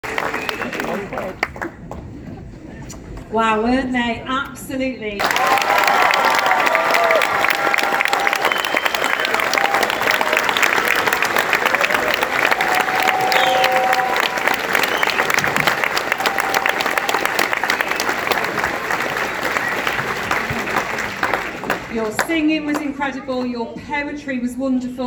Soundscapes > Indoors
Applause in leisure centre hall
Recorded in leisure centre on my Samsung Galaxy mobile phone - applause after school play with some chatting (it's Cheshire, England, 2025).
Applause, Clapping, Crowd, England, Hall, Indoors, Leisure